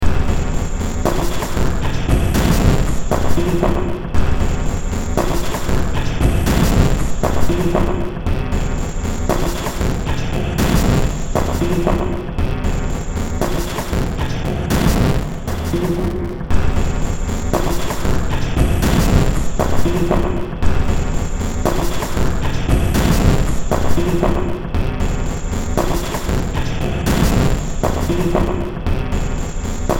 Music > Multiple instruments
Demo Track #3317 (Industraumatic)
Horror, Ambient, Noise, Cyberpunk, Games